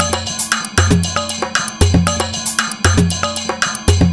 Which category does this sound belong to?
Music > Other